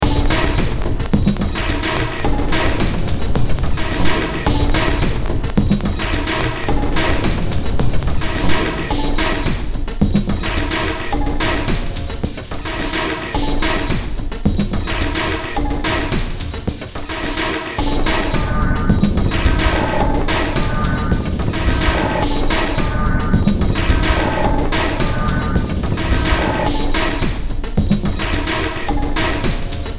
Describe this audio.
Music > Multiple instruments
Demo Track #3506 (Industraumatic)
Track taken from the Industraumatic Project.
Horror, Cyberpunk, Soundtrack, Underground, Industrial, Sci-fi, Noise, Ambient, Games